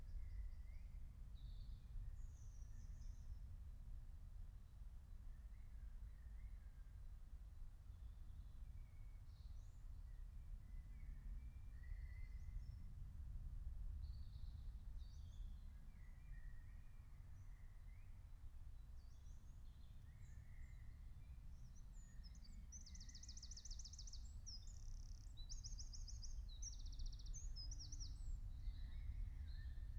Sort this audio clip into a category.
Soundscapes > Nature